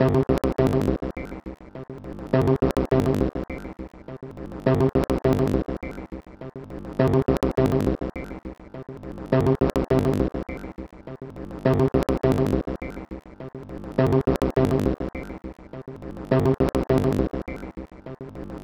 Instrument samples > Percussion
This 103bpm Drum Loop is good for composing Industrial/Electronic/Ambient songs or using as soundtrack to a sci-fi/suspense/horror indie game or short film.
Samples, Loop, Drum, Ambient, Industrial, Underground, Packs, Weird, Alien, Loopable, Soundtrack, Dark